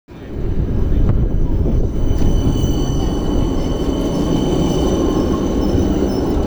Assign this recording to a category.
Sound effects > Vehicles